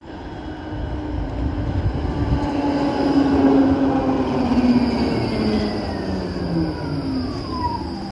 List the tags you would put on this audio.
Sound effects > Vehicles
field-recording tram Tampere

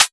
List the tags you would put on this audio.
Instrument samples > Synths / Electronic
electronic fm synthetic

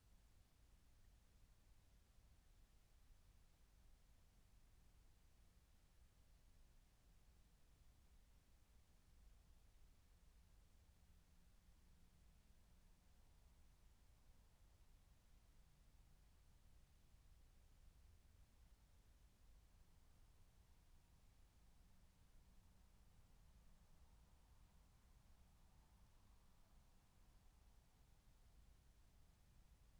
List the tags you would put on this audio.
Soundscapes > Nature
soundscape natural-soundscape nature field-recording meadow alice-holt-forest

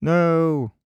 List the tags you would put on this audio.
Speech > Solo speech
2025 Adult Calm FR-AV2 Generic-lines Hypercardioid july Male mid-20s MKE-600 MKE600 no nooo Sennheiser Shotgun-mic Shotgun-microphone Single-mic-mono Tascam VA Voice-acting

